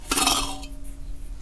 Sound effects > Other mechanisms, engines, machines
Woodshop Foley-073
bam, bang, boom, bop, crackle, foley, fx, knock, little, metal, oneshot, perc, percussion, pop, rustle, sfx, shop, sound, strike, thud, tink, tools, wood